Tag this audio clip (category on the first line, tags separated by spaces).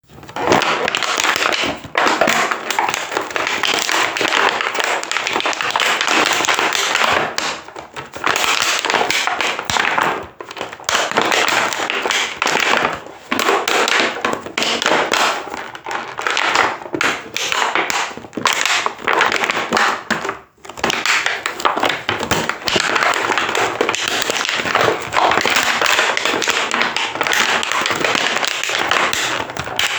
Sound effects > Objects / House appliances
plastic-detonations plastic-out Plastic-recycling